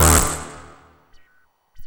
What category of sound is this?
Instrument samples > Synths / Electronic